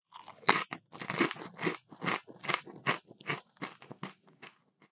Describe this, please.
Sound effects > Other
FOODEat Cinematis RandomFoleyVol2 CrunchyBites TortillaChipsBite ClosedMouth NormalChew Freebie
This is one of the several freebie items of my Random Foley | Vol.2 | Crunchy Bites pack.